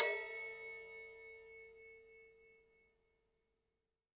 Music > Solo instrument
Cymbal hit with knife-011
Crash, Custom, Cymbal, Cymbals, Drum, Drums, FX, GONG, Hat, Kit, Metal, Oneshot, Paiste, Perc, Percussion, Ride, Sabian